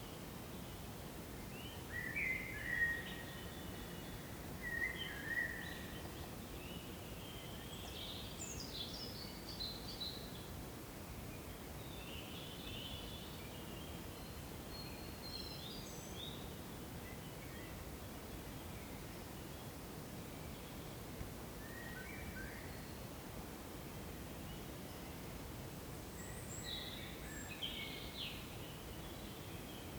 Soundscapes > Nature
20250418 17h02-18h00 - Gergueil forest chemin de boeuf
Subject : One of a few recordings from 10h37 on Friday 2025 04 18, to 03h00 the Saturday. Date YMD : 2025 04 18 Location : Gergueil France. "Chemin de boeuf". GPS = 47.23807497866109, 4.801344050359528 ish. Hardware : Zoom H2n MS mode (decoded in post) Added wind-cover. Weather : Half cloudy, little to no wind until late evening where a small breeze picked up. Processing : Trimmed and Normalized in Audacity.
spring, birds, nature, ambience, April, H2N, 21410, Cote-dor, ambiance, Forest, MS, France, Mid-side, Zoom-H2N, field-recording, Gergueil, forret, Rural, windless, country-side